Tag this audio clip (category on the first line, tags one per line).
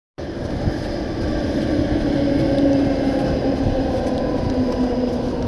Soundscapes > Urban
recording
tram
Tampere